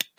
Sound effects > Objects / House appliances
Flashlight button
I used my flashlight to make this sound and for my animations
Appliences, Flashlight